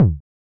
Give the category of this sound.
Instrument samples > Percussion